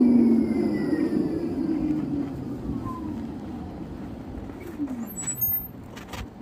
Soundscapes > Urban
final tram 20
finland, hervanta, tram